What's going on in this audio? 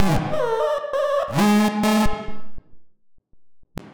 Sound effects > Electronic / Design
Optical Theremin 6 Osc Shaper Infiltrated-011
Sounds from an Optical Theremin I built from scratch that uses 3 Main Oscillators all ring modded to one another , each Oscillator is connected to 2 Photoresistors and an old joystick from PS2 controllers. The sounds were made by moving the unit around my studio in and out of the sun light coming through the skylights. further processing was done with Infiltrator, Rift, ShaperBox, and Reaper
Machine, Noise, Mechanical, FX, Synth, strange, Loopable, Weird, IDM, Alien, Robotic, DIY, Impulse, Robot, Analog, Oscillator, Pulse, Experimental, Electronic, Tone, Otherworldly, Saw, Gliltch, SFX, EDM, Electro, Crazy, Theremin, Chaotic